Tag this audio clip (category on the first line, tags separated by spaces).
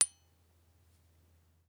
Sound effects > Other mechanisms, engines, machines
light sample light-bulb bulb